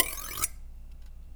Sound effects > Objects / House appliances
knife and metal beam vibrations clicks dings and sfx-051
Beam, Clang, ding, Foley, FX, Klang, Metal, metallic, Perc, SFX, ting, Trippy, Vibrate, Vibration, Wobble